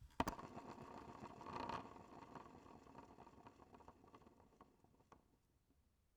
Sound effects > Objects / House appliances
250726 - Vacuum cleaner - Philips PowerPro 7000 series - head wheel spin 2
7000, aspirateur, Hypercardioid, MKE-600, MKE600, Sennheiser, Shotgun-mic, Shotgun-microphone, Single-mic-mono, vacuum